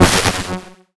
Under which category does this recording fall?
Instrument samples > Synths / Electronic